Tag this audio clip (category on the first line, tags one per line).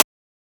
Instrument samples > Percussion
8-bit
FX
game
percussion